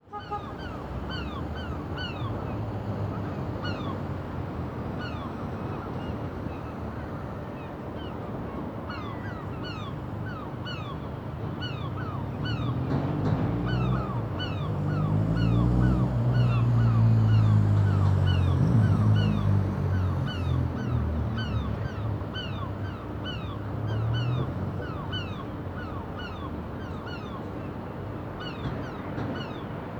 Soundscapes > Other
Saint Nazaire industrial seagulls
industrial, bridge, harbour, field-recording, docks, seagulls, ambiance, work, engine, port, Saint-Nazaire, boat, ship, industrie